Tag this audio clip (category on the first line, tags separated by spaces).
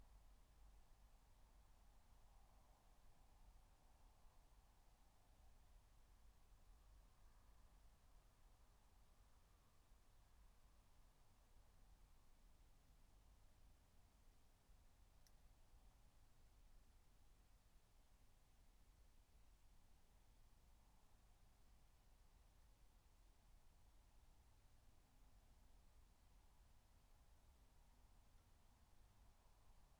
Soundscapes > Nature
soundscape nature raspberry-pi alice-holt-forest meadow field-recording natural-soundscape phenological-recording